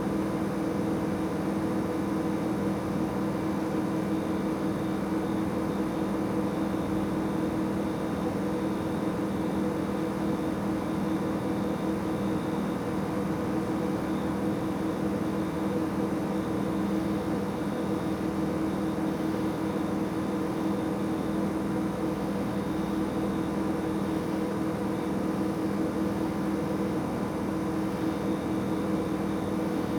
Soundscapes > Indoors
Placed my recorder on the floor of our garage, and captured the gentle hum of the refrigerator. Very quiet, very ambient. Makes for a great background loop. Cut to loop, so it can play end on end. Recorded with a Tascam DR-100mkii, processed in Pro Tools.